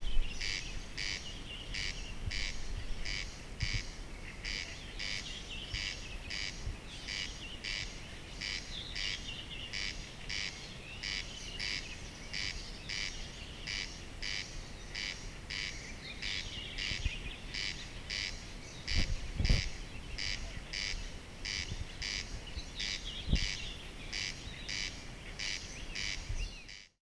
Soundscapes > Nature
CORNCRAKE and other night birds 2
corncrake and other night birds recorded with Zoom H1n
ambiance, ambient, birds, corncrake, field-recording, nature, spring